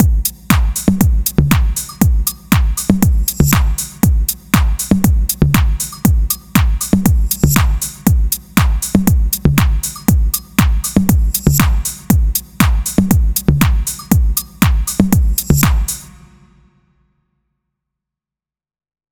Music > Other
A house loop I made in Caustic 3. 119 bpm.
119, Dance, Drums, EDM, Electro, HiHat, House, Loop, Minimal, Techno